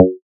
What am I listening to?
Instrument samples > Synths / Electronic
FATPLUCK 4 Gb
additive-synthesis, bass